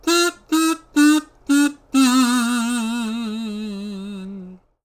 Music > Solo instrument
MUSCInst-Blue Snowball Microphone, CU Kazoo, 'Failure' Accent 03 Nicholas Judy TDC
A kazoo 'failure' accent.